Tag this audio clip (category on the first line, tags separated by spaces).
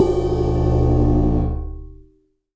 Music > Solo instrument
Cymbals Kit Paiste Percussion Drum 22inch Cymbal Drums Metal Oneshot Custom Ride Perc